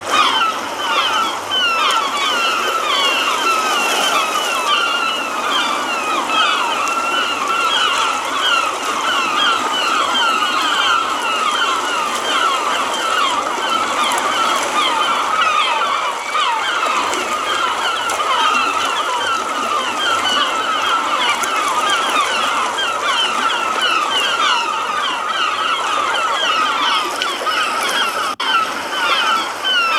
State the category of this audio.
Soundscapes > Nature